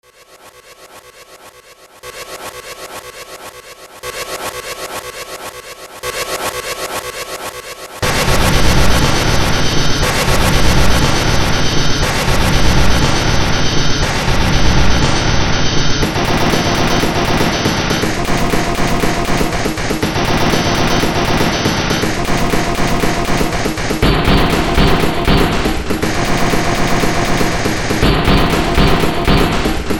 Music > Multiple instruments
Demo Track #3924 (Industraumatic)
Ambient, Cyberpunk, Games, Horror, Industrial, Noise, Sci-fi, Soundtrack, Underground